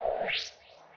Soundscapes > Synthetic / Artificial
LFO Birdsong 31
bird, lfo, massive